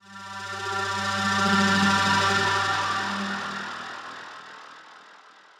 Sound effects > Electronic / Design
One-shot FX designed for quick transitions and drops. Sharp, clean, and impactful — perfect for adding tension, accentuating changes, or layering in bass music and psytrance productions. 150 BPM – G minor – heavily processed, so results may vary!
sound-design, sounddesign, psytrance, psy, soundeffect, efx, psyhedelic, sfx, sci-fi, fx, sound, electric, effect, abstract